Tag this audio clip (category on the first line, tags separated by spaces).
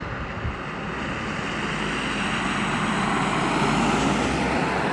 Soundscapes > Urban
city car tyres driving